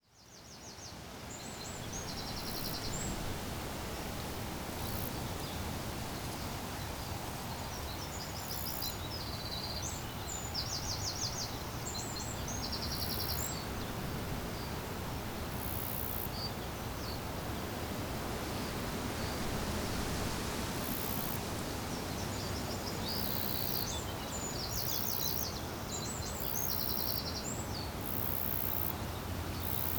Soundscapes > Nature
A morning recording at Croxall Lakes, Staffordshire.